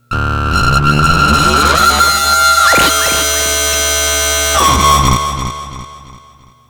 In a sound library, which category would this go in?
Sound effects > Electronic / Design